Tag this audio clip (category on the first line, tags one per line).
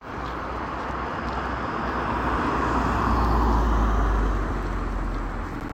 Sound effects > Vehicles
car
road